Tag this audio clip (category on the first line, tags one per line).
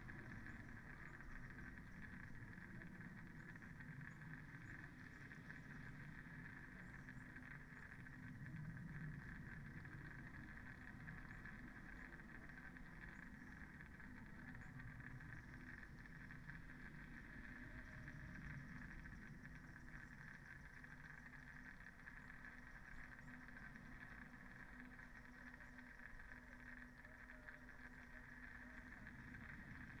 Soundscapes > Nature
Dendrophone
field-recording
natural-soundscape
nature
raspberry-pi
sound-installation
soundscape
weather-data